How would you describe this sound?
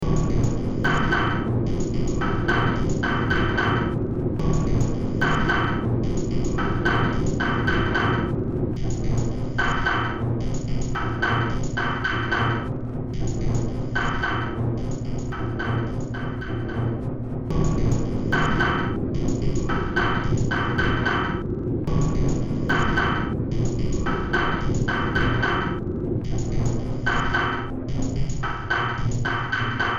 Music > Multiple instruments
Demo Track #3971 (Industraumatic)
Soundtrack, Underground, Industrial, Sci-fi, Horror, Cyberpunk, Games, Ambient, Noise